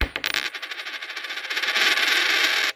Sound effects > Objects / House appliances
OBJCoin-Samsung Galaxy Smartphone, CU Quarter, Drop, Spin 11 Nicholas Judy TDC
quarter, foley, spin, drop